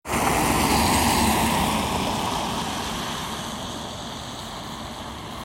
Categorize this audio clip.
Sound effects > Vehicles